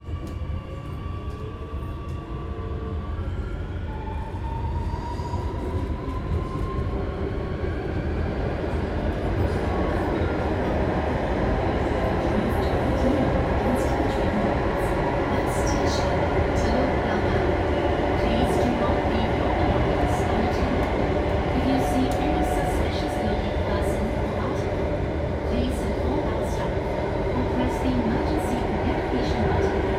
Soundscapes > Indoors
Train Whirring
The sound on an MRT (Mass Rapid Transit) Train in Singapore